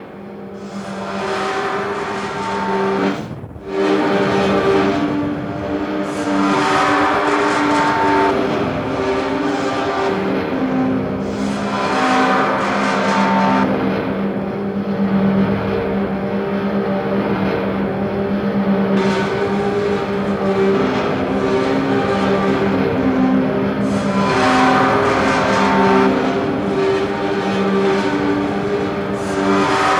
Sound effects > Experimental
Konkret Jungle 13
From a pack of samples focusing on ‘concrete’ and acousmatic technique (tape manipulation, synthetic processing of natural sounds, extension of “traditional” instruments’ timbral range via electronics). This excerpt is based upon multiple samples of bowed cymbals, overlapping one another via the 'morph control' on a Soundhack Morphagene module.
tape-manipulation, objet-sonore, musique, acousmatic, SoundHack, Morphagene, bowed-metal, extended-technique